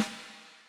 Music > Solo percussion
Snare Processed - Oneshot 202 - 14 by 6.5 inch Brass Ludwig
drums, perc, rimshot, drumkit, oneshot, hit, realdrum, brass, roll, crack, percussion, drum, kit, acoustic, flam